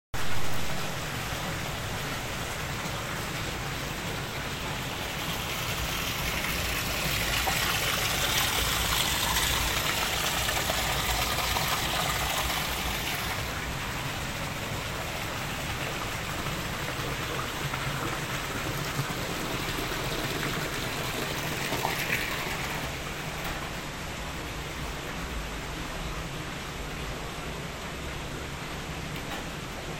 Soundscapes > Nature
acqua corrente fontana (running water from a fountain)
water of a fountain in mountain recorded with cell phone